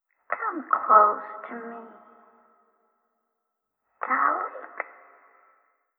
Speech > Processed / Synthetic
Recorded "Come close to me" and distorted with different effects.